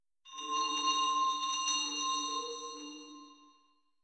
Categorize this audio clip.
Sound effects > Electronic / Design